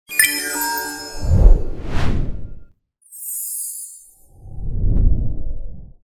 Sound effects > Other
intro logo
A short 6-second sound effect for a logo intro. Clean and simple, great for videos, intros, or branding